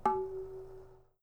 Sound effects > Objects / House appliances
TOONImpt-Blue Snowball Microphone Bonk, Metal Nicholas Judy TDC
A metal bonk.
Blue-brand, Blue-Snowball, bonk, cartoon, metal